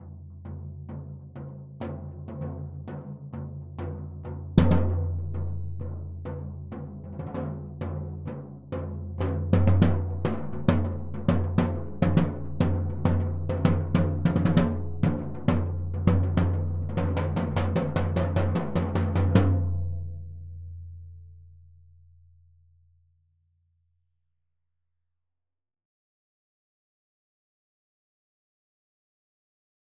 Music > Solo percussion
floor Tom-loose beats - 16 by 16 inch
flam, percussion, tom, perc, drum, floortom, velocity, toms, instrument, oneshot, tomdrum, drumkit, drums, acoustic, fill, rim, roll, beat, percs, kit, rimshot, beats, beatloop, studio